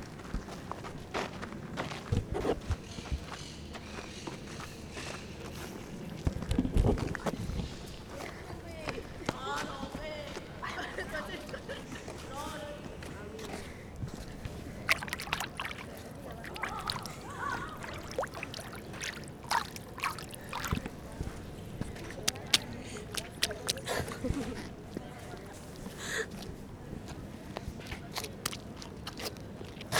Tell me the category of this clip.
Soundscapes > Urban